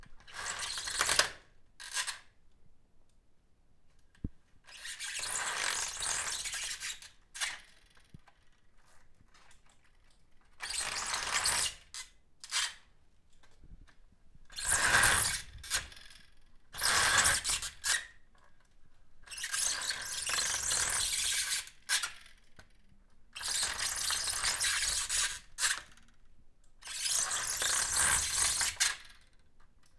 Sound effects > Objects / House appliances
SFX Indoor HangingBlinds
background, blinds, field-recording, foley, indoor, movement, quiet, soundscape, subtle, texture